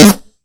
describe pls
Instrument samples > Percussion
snare multiloop fakery 1
A junksnare only good as a very attenuated timbre noise backlayer. A struggling multiloop (it has repetitions) fakesnare for a backing noise for a DIFFERENT mainsnare.
abysmal, alienware, appalling, atrocious, awful, cheap, crappy, dreadful, fakery, fakesnare, grotty, horrible, inferior, junk, junkware, junky, lousy, miserable, pathetic, shoddy, snare, subpar, terrible, third-rate, trashy, worthless, wretched